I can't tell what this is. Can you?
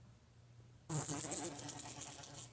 Sound effects > Animals
Insects - Fly; Large Fly Buzzing at a Window; Close Perspective
A large fly was buzzing at a window, and it made this rather loud buzz, which I thought would make sense to record, which I did with an LG Stylus 2022.
fly, buzz